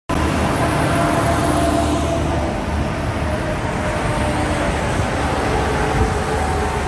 Sound effects > Vehicles
Sun Dec 21 2025 (4)
road, truck, highway